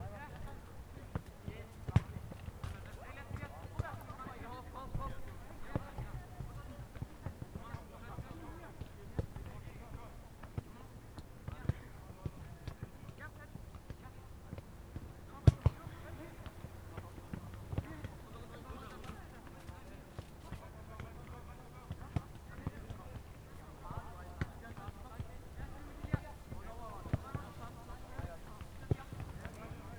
Soundscapes > Urban
Soccer Practice and Church Bells
Local soccer field during practice with players shous, ball kicks and background chatter. After few minutes church bell ring close by, blending naturally with the outdoor atmosphere. Recorded on Zoom H4n Pro (stock mics)
ambience, bells, church, field, football, kids, outdoor, play, practice, reverb, shouting, soccer